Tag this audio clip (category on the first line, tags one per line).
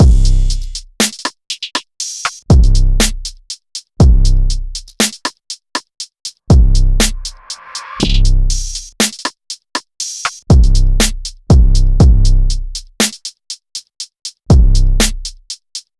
Music > Multiple instruments
sample
drum
trap-drums
1lovewav
hip-hop